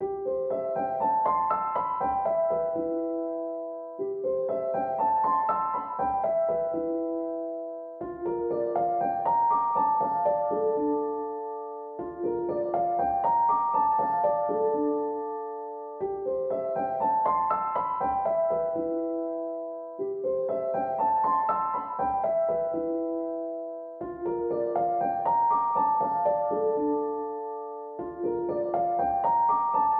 Music > Solo instrument
Piano loops 195 octave up short loop 120 bpm
simplesamples, samples, simple, loop, piano, pianomusic, reverb, music, free, 120bpm, 120